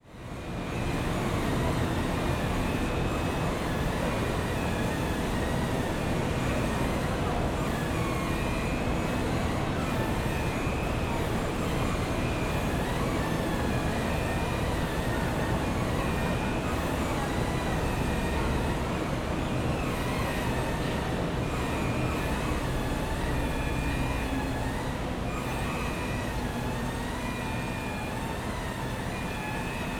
Soundscapes > Indoors
Field recording in the garage of a catamaran ferry as it approaches a port. Engine noise and people can be heard.